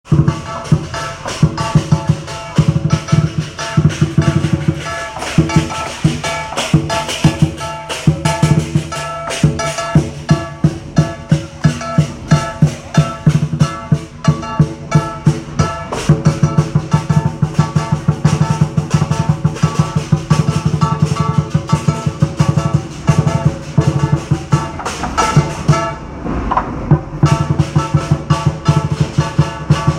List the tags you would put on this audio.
Music > Multiple instruments
dance
music
qi-lin

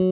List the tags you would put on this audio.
Instrument samples > String
cheap
design
tone
guitar
sound
stratocaster
arpeggio

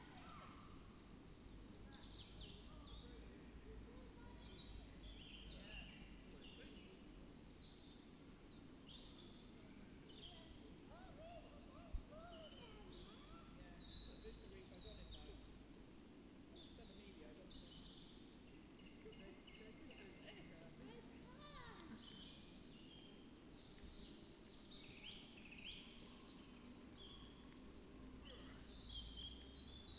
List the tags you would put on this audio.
Soundscapes > Nature
field-recording
sound-installation
soundscape
natural-soundscape
raspberry-pi
artistic-intervention
Dendrophone
nature
modified-soundscape
data-to-sound
phenological-recording
alice-holt-forest
weather-data

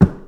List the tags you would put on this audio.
Sound effects > Objects / House appliances
bucket
carry
clang
clatter
cleaning
container
debris
drop
fill
foley
hollow
household
knock
lid
liquid
metal
object
plastic
pour
scoop
shake
slam
tip
tool

